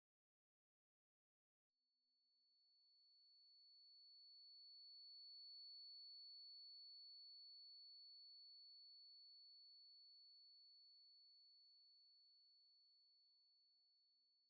Sound effects > Electronic / Design
A high-pitched squeaking sound, resembling tinnitus effect, created using a synthesizer.
ingingsound, highfrequency, earwhistle, soundeffect, tinnitus, earring, horror, short, sounddesign, atmospheric, highpitch